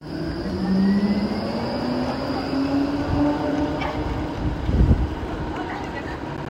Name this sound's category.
Sound effects > Vehicles